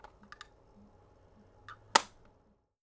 Sound effects > Objects / House appliances
OBJCont-Blue Snowball Microphone, CU Wristwatch Box, Open, Close Nicholas Judy TDC
A wristwatch box opening and closing.
Blue-brand, Blue-Snowball, close, foley, open, wristwatch-box